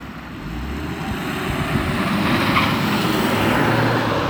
Soundscapes > Urban
Car passing by 8
car, city, driving, tyres